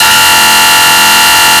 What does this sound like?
Instrument samples > Percussion
Synthed with phaseplant only. Processed with Khs Distortion, ZL EQ, Waveshaper, Fruity Limiter.
Color, ColorKick, Colorstyle, Hardstyle, Rawstyle, Zaag, ZaagKick